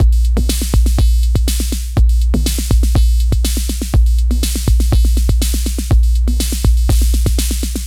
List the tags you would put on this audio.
Music > Solo percussion
Kit Analog Loop music Bass Mod Drum DrumMachine Modified Electronic 606 Vintage Synth

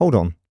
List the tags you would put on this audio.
Speech > Solo speech
Sennheiser mid-20s MKE600 Shotgun-microphone Male Hypercardioid Adult hold-on july VA Voice-acting MKE-600 Generic-lines

